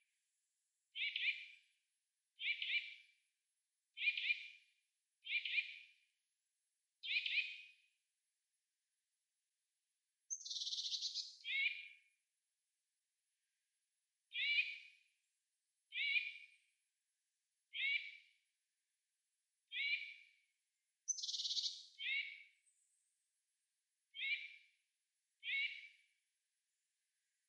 Sound effects > Animals
eurasian nuthatch alarm call
Eurasian nuthatch (aka. wood nuthatch, nuthatch) alarm call. Location: Poland Time: November 2025 Recorder: Zoom H6 - SGH-6 Shotgun Mic Capsule
alarm, alarm-call, bird, call, eurasian-nuthatch, isolated, nuthatch, wood-nuthatch